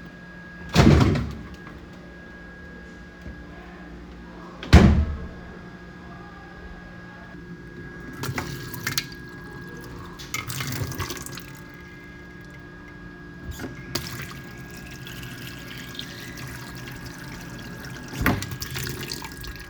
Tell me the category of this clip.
Sound effects > Natural elements and explosions